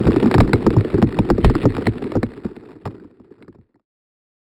Sound effects > Electronic / Design
Deep Ice Cracking4
A Deep Ice Cracking inside of ice Glaciers designed with Pigments via studio One